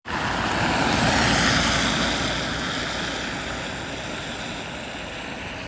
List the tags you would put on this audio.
Sound effects > Vehicles
car engine vehicle

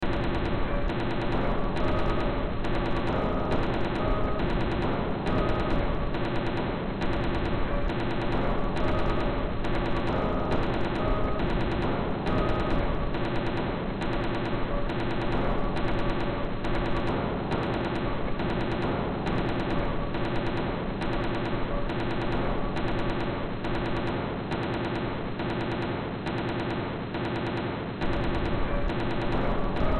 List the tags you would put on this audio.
Music > Multiple instruments
Ambient Games Horror Sci-fi Soundtrack Underground

